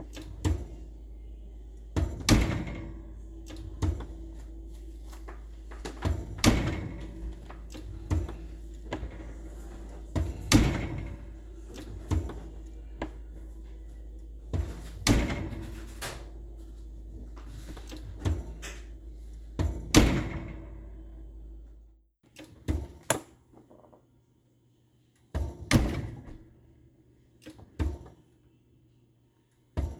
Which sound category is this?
Sound effects > Objects / House appliances